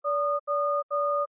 Sound effects > Electronic / Design
Warning sound notification
Made using a generated dial tone sound in ocenaudio and adjusting the pitch and speed of the sound. Used in my visual novel: R(e)Born_ Referenced with AKG K240.